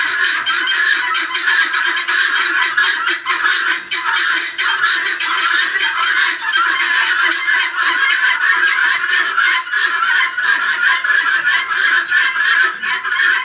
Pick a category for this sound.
Sound effects > Animals